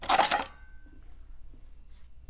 Sound effects > Objects / House appliances
knife and metal beam vibrations clicks dings and sfx-077
ting, Metal, Foley, SFX, Vibrate, Clang, Trippy, metallic, Vibration, FX, ding, Wobble, Klang, Beam, Perc